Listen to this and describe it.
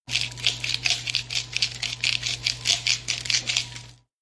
Sound effects > Objects / House appliances
FOODIngr-Samsung Galaxy Smartphone, CU Pepper Grinder, Grinding Nicholas Judy TDC
A pepper grinder grinding.
Phone-recording, grind, foley, pepper-grinder